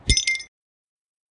Sound effects > Objects / House appliances
Metal Bottle cap drops
recorded on: hyperx quadcast edited on: audacity, noise cancelling and trimming i recorded this at my university garden -alara kanat
bottle, cap, drop, metal, metallic